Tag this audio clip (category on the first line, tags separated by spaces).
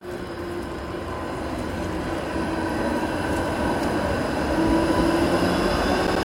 Sound effects > Vehicles
rain tampere tram